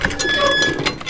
Sound effects > Other mechanisms, engines, machines
Handcar Pump 2 (Out 1)
Handcar aka pump trolley, pump car, rail push trolley, push-trolley, jigger, Kalamazoo, velocipede, gandy dancer cart, platelayers' cart, draisine, or railbike sound effect, designed. First pull sound of a total of 4. Can be used in sequence in1-out1-in2-out2 or in1/out1 can be randomly swapped with in2/out2.
animation, cart, crank, cranking, creak, creaking, draisine, gandy, hand, handcar, hand-crank, hard, heavy, iron, jigger, kalamazoo, mechanism, metal, pump, pumping, push, rail, railbike, sound-design, squeak, squeaking, tedious, trolley, vehicle, velocipede